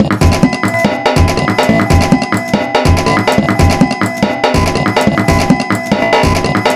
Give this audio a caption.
Music > Other
FL studio 9 construction d'un pattern